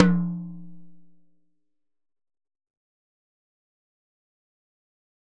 Music > Solo percussion
Hi Tom- Oneshots - 50- 10 inch by 8 inch Sonor Force 3007 Maple Rack
acoustic, beat, drumkit, fill, flam, hitom, kit, percs, percussion, rim, rimshot, roll, tom, tomdrum, toms